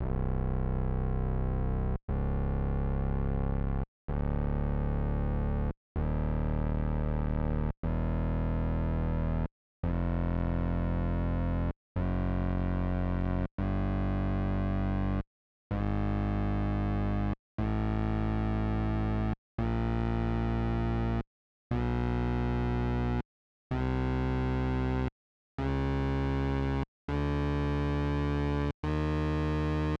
Soundscapes > Synthetic / Artificial
232 Synth Week 6 PC Pad Vaccum
Pad, Pulse, Synth